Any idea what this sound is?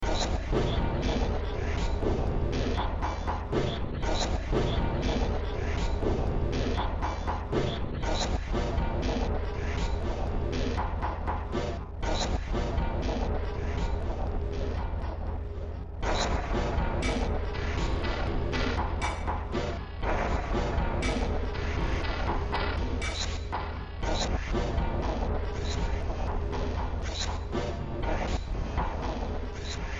Music > Multiple instruments
Demo Track #3191 (Industraumatic)
Ambient, Cyberpunk, Games, Horror, Industrial, Noise, Sci-fi, Soundtrack, Underground